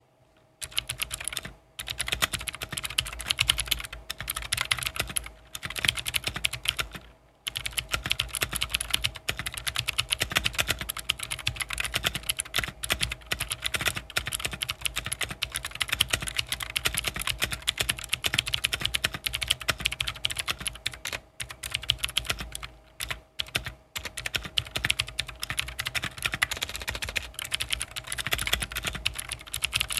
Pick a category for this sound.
Sound effects > Other